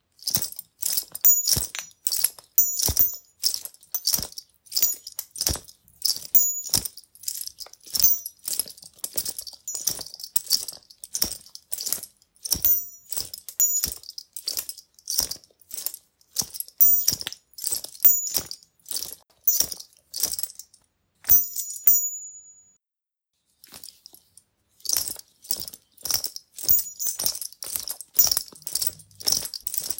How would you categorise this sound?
Sound effects > Human sounds and actions